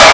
Instrument samples > Percussion
BrazilFunk Clap 2
Used a sample called ''FPC Wack'' from Flstudio original sample pack. Processed with ZL EQ, Waveshaper.